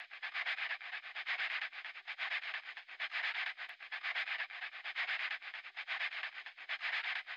Music > Solo percussion
guitar scratch percussion loop
guitar, riser, techno